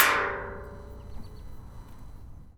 Sound effects > Objects / House appliances
Junkyard Foley and FX Percs (Metal, Clanks, Scrapes, Bangs, Scrap, and Machines) 53
Ambience, Atmosphere, Bang, Bash, Clang, Clank, Dump, dumping, dumpster, Environment, Foley, FX, garbage, Junk, Junkyard, Machine, Metal, Metallic, Perc, Percussion, rattle, Robot, Robotic, rubbish, scrape, SFX, Smash, trash, tube, waste